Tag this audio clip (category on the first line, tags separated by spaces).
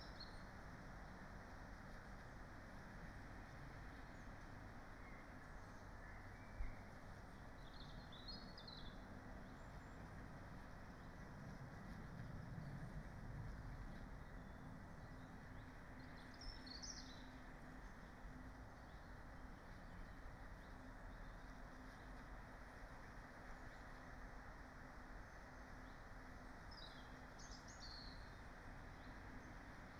Soundscapes > Nature
sound-installation Dendrophone modified-soundscape field-recording soundscape natural-soundscape phenological-recording nature alice-holt-forest weather-data